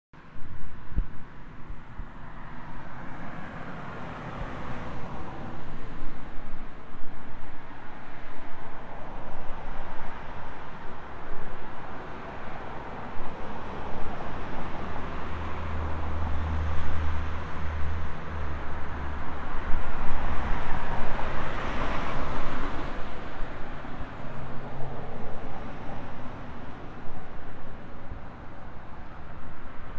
Sound effects > Other
traffic on a busy street
I recorded cars passing by.
ambience, cars, field-recording, street, traffic